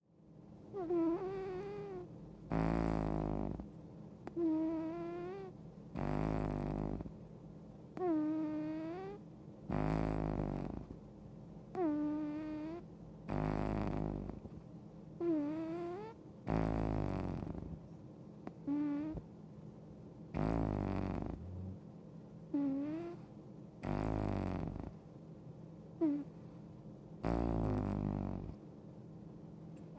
Sound effects > Animals
Cat snoring
Originally recorded to play for my vet, got told it's just old age so decided to clean it up and upload. Snoring starts off quite strong, but softens up a little as it goes on.
domestic, cat, snoring